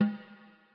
Music > Solo percussion
Snare Processed - Oneshot 226 - 14 by 6.5 inch Brass Ludwig
crack, drum, drumkit, drums, hit, hits, percussion, realdrum, rimshot, roll, sfx, snareroll, snares